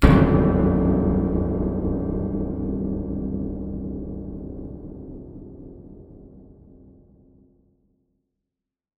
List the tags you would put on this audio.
Sound effects > Other
horror-sting horror-stings terrifying jumpscare-noise cinematic-hit cinematic-stab horror-stab dylan-kelk horror-hit jumpscare horror-impact cinematic-sting

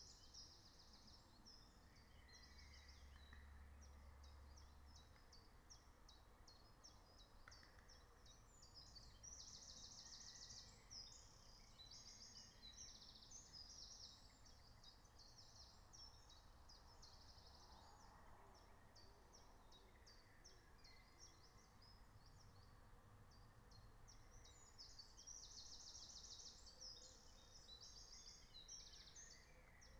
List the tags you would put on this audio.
Soundscapes > Nature
field-recording raspberry-pi soundscape

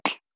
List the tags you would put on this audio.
Sound effects > Experimental
cartoon fight fist hit punch slap smack

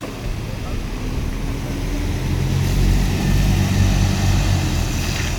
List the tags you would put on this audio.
Sound effects > Vehicles
bus transportation

